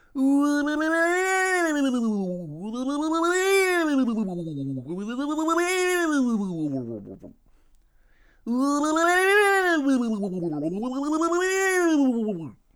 Sound effects > Experimental
Time Dilation Mouth Sound
A strange sound made with my own mouth. Sounds stupid on its own, but I have layered this into various time-travel effects in the past and it sounds quite good once you mess around with it a bit, so I figured I'd upload it in case someone wanted to use it. I recommend pairing this with the sounds of a tape recorder or a ticking clock.
fast, wobble